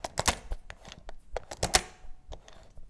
Sound effects > Objects / House appliances
The sound of a door lock closing